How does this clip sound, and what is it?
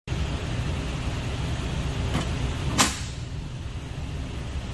Sound effects > Other mechanisms, engines, machines
This sound captures the click of an Electrical power panel switch being activated.